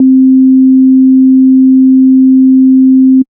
Instrument samples > Synths / Electronic
01. FM-X SINE C3root
FM-X, MODX, Montage, Yamaha